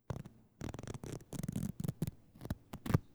Sound effects > Objects / House appliances
Ripping Fabric, Seam Ripper, Tearing, Slow
Tearing fabric with a seam ripper.
clothing, tearing, ripping, foley, destruction, fabric